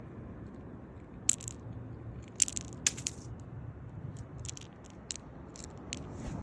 Sound effects > Other
Falling Rocks
Just some test sounds for a project of mine, created by dropping rocks on a sidewalk.
Quiet, Wind, Rocks